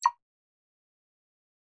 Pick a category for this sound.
Sound effects > Electronic / Design